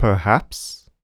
Speech > Solo speech
Doubt - Perhaps 3
dialogue, doubt, FR-AV2, Human, Male, Man, Mid-20s, Neumann, NPC, oneshot, perhaps, singletake, Single-take, skeptic, skepticism, talk, Tascam, U67, Video-game, Vocal, voice, Voice-acting, word